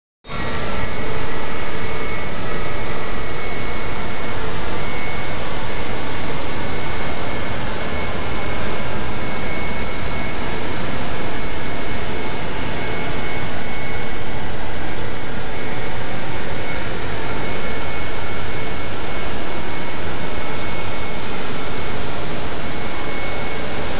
Soundscapes > Synthetic / Artificial
Down The Grain 1
noise, experimental, granulator, electronic, sample, effects, glitch, free, sfx, samples, sound, royalty, soundscapes, packs